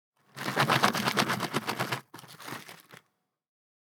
Sound effects > Other
FOODEat Cinematis RandomFoleyVol2 CrunchyBites Food.Bag Peanuts PouringInHand Freebie
This is one of the several freebie items of my Random Foley | Vol.2 | Crunchy Bites pack.
bag, bite, bites, crunch, crunchy, design, effects, handling, peanuts, postproduction, recording, rustle, SFX, snack, texture